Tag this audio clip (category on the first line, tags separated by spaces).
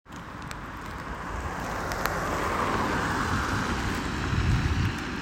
Sound effects > Vehicles
tampere field-recording car